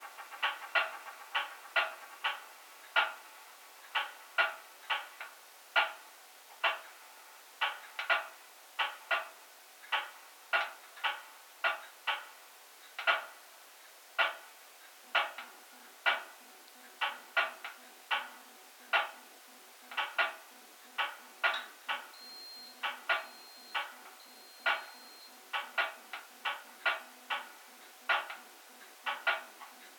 Soundscapes > Indoors
Contact mic recording of oven heating up. Recorded on zoom h2n.